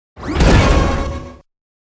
Sound effects > Other mechanisms, engines, machines
Big robot footstep 003
Big robot footstep SFX ,is perfect for cinematic uses,video games. Effects recorded from the field.
big, clang, clank, deep, footstep, futuristic, giant, heavy, impact, mechanical, metal, metallic, movement, resonant, robot, robotic, sci-fi, stomp, thud, walking